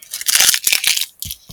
Sound effects > Other
a sound made from a wrapper being crumpled that sound like a crunch made with the crappy microphone that is in my computer